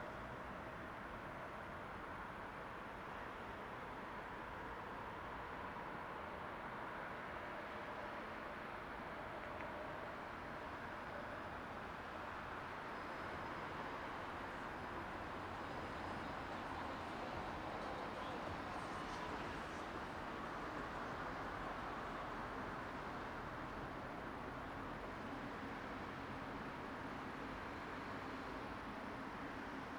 Soundscapes > Nature
Continuous traffic noise with multiple cars driving in the background.
noise, vehicles, road, ambience, traffic, city, street, cars